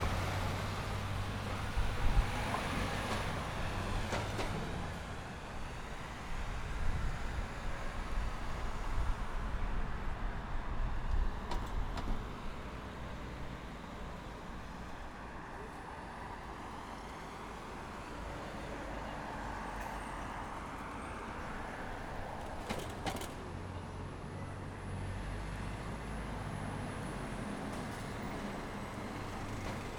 Soundscapes > Urban
sounds of a slightly busy London neighborhood. Unprocessed sound, captured with a Zoom H6